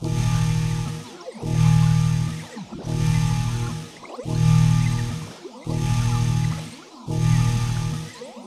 Sound effects > Electronic / Design
Alarm sound effect. Waaaaaa Waaaaaaaaa Waaaaaa with small wiwiwiwiiwiwiwiwiwiw. <3